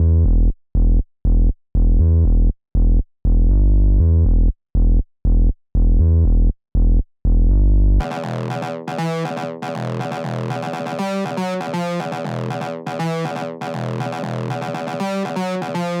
Music > Other
fragment 2 - 120 BPM
Fragment of an unfinished song with the drums removed. Use for whatever you feel like.
fragment, synthesizer